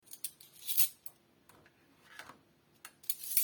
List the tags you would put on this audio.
Sound effects > Objects / House appliances
cook
cutlery
fork
knife
metal
rummaging
spoon

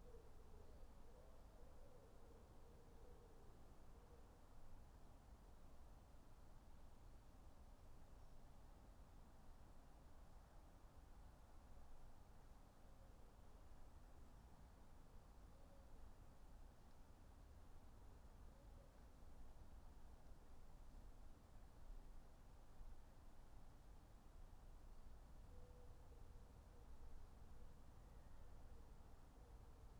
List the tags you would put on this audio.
Soundscapes > Nature
alice-holt-forest
artistic-intervention
data-to-sound
Dendrophone
field-recording
modified-soundscape
natural-soundscape
nature
phenological-recording
raspberry-pi
soundscape
weather-data

